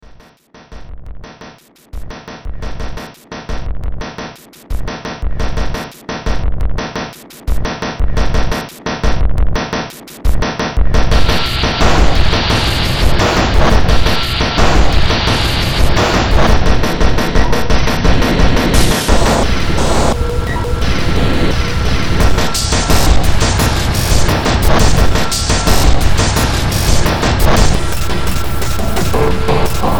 Music > Multiple instruments
Demo Track #3278 (Industraumatic)
Ambient, Cyberpunk, Games, Horror, Industrial, Noise, Sci-fi, Soundtrack, Underground